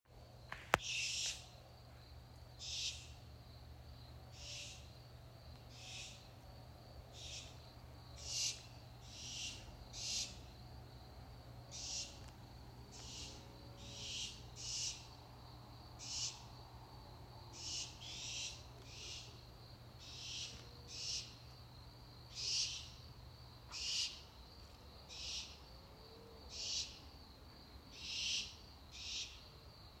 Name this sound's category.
Soundscapes > Nature